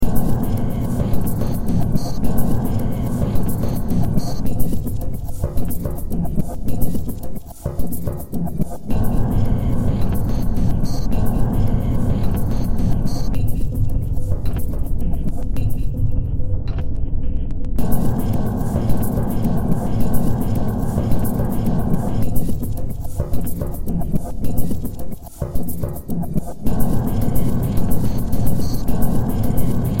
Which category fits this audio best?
Music > Multiple instruments